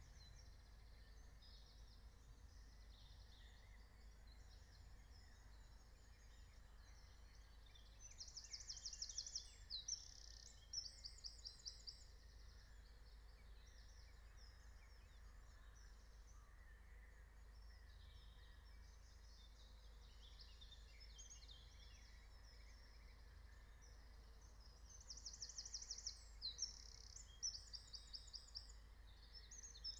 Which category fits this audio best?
Soundscapes > Nature